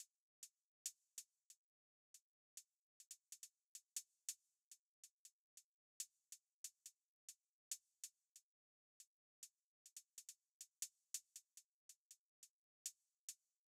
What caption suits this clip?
Instrument samples > Percussion
interesting trap hi-hat loop (140bpm)
symbols, hihats, drums, loop, drum, trap, 140bpm, sample